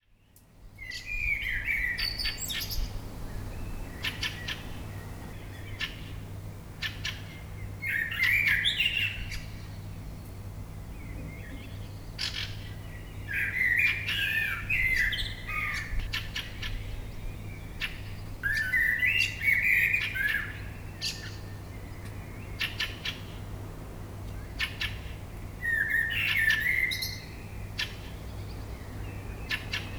Soundscapes > Nature
A beautiful morning concert by birds - 16.04.2017 - Haar - Germany
A wonderful morning concert of blackbirds, crows, magpies, sparrows, and other birds in a park at a psychiatric hospital in Haar near Munich. I placed it on the terrace during my night shift and did some light post-processing -I removed a few background noises and increased the level slightly to hear the other birds in the background a little bit better. The recording was made on April 16, 2017, between 5 and 6 a.m. Sometimes you can faintly hear the cars of the staff arriving for the early shift. At other times, it sounds more like wind. After about 30 minutes, a little rain starts occasionally. I haven't edited it otherwise, so you can enjoy the entire concert. Enjoy! If anyone would like to send me the results of their use of this unique avian concert, I would be delighted. If not, that's perfectly fine too. All the best and enjoy the sounds of these lovely birds.
Field-Recording, Birdsong, Birds, Peaceful, Blackbird, Park, Nature, Morning